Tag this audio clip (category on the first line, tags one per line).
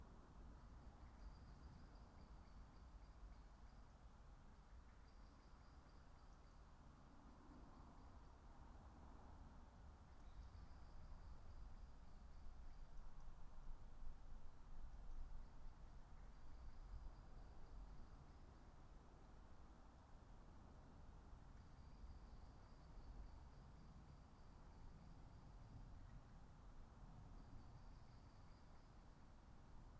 Soundscapes > Nature
sound-installation
nature
artistic-intervention
alice-holt-forest
soundscape
data-to-sound
raspberry-pi
field-recording
phenological-recording
weather-data
Dendrophone
natural-soundscape
modified-soundscape